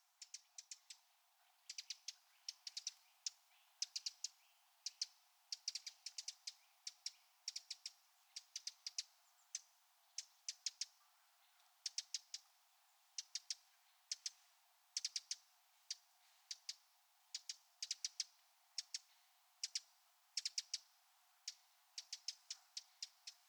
Sound effects > Animals
birds eurasian wren alarm close1
Eurasian wren bird singing